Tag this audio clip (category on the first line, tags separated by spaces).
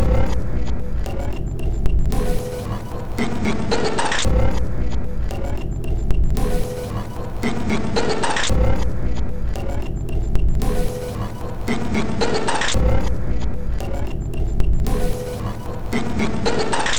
Instrument samples > Percussion
Soundtrack Dark Ambient Alien Packs Drum